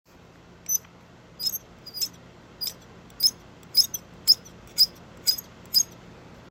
Sound effects > Objects / House appliances
forks scraping together. creepy sound